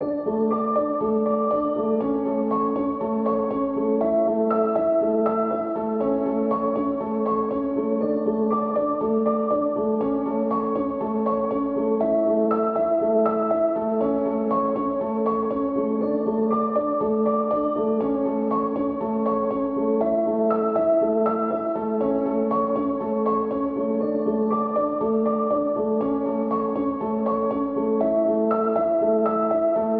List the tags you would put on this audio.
Music > Solo instrument
samples 120 pianomusic free simple piano music reverb 120bpm loop simplesamples

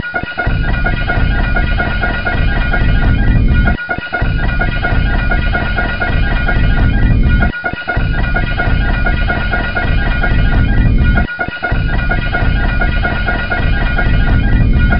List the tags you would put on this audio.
Instrument samples > Percussion
Soundtrack Drum Ambient Loop Industrial Underground Packs Loopable Alien

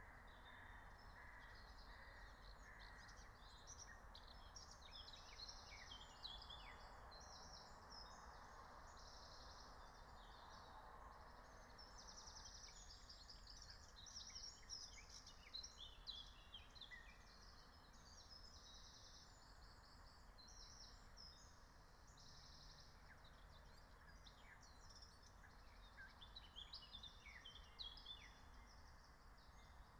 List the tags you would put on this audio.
Soundscapes > Nature
alice-holt-forest field-recording meadow natural-soundscape nature phenological-recording raspberry-pi soundscape

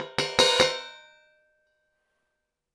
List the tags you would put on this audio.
Music > Solo instrument
Crash Custom Cymbal Cymbals Drum Drums FX GONG Hat Kit Metal Oneshot Paiste Perc Percussion Ride Sabian

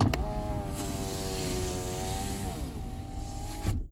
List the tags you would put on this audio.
Sound effects > Vehicles
car Phone-recording electric window up

